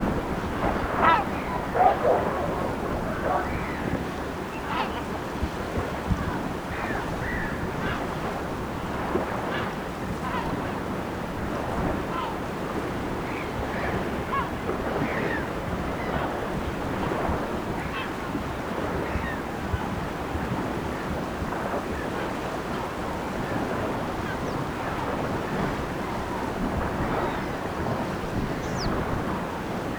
Soundscapes > Nature
lake shore-day 1
Ambient recording in a lake shore. Medium wind. Some seagulls and dogs might be heard.